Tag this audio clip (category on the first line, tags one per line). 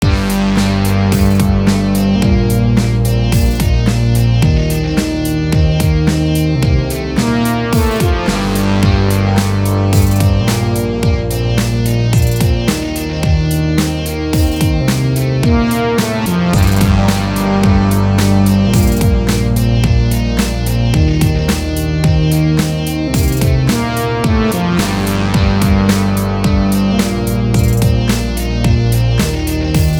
Music > Multiple instruments
Video-Game
Guitar
Energetic
BflatMajor
video
game
Electric
4over4timesignature
109bpm